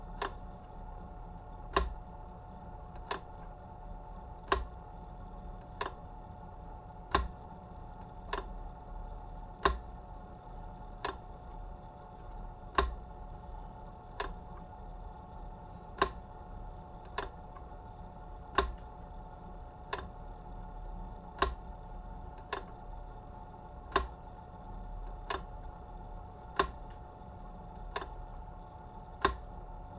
Sound effects > Other mechanisms, engines, machines
COMAv-Blue Snowball Microphone, MCU Projector, Film, Switch, 8mm, Super 8 Nicholas Judy TDC
Bell and Howell 8mm-Super 8 film projector switching from 8mm to super 8 and back.